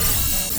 Sound effects > Electronic / Design
A glitch one-shot SX designed in Reaper with Phaseplant and various plugins. A glitch one-shot SX designed in Reaper with Phaseplant and various plugins.